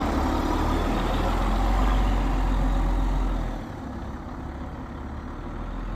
Sound effects > Vehicles
final bus 23
hervanta, finland, bus